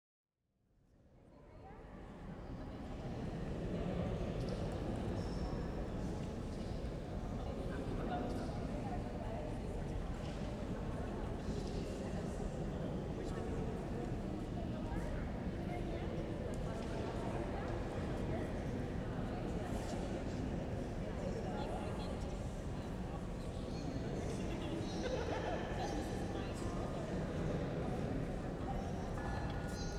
Sound effects > Human sounds and actions
Lush museum atrium ambiance with some human muttering
Long reverb in a big museum atrium
ambiance, ambience, ambient, atmos, atmosphere, atmospheric, background, background-sound, drone, echo, general-noise, museum, noise, reverb, soundscape, white-noise